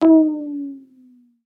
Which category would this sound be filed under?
Sound effects > Electronic / Design